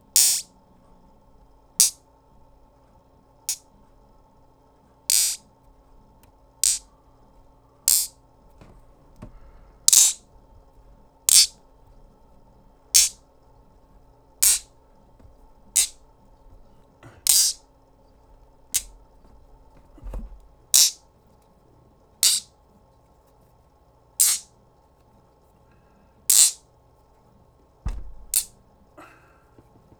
Sound effects > Electronic / Design
LASRMisc-Blue Snowball Microphone, CU Simulated, Buzzing Noisemaker Magnets Nicholas Judy TDC
Buzzing noisemaker magnets simulating lasers.
Blue-Snowball, noisemaker, buzz, laser, magnet, Blue-brand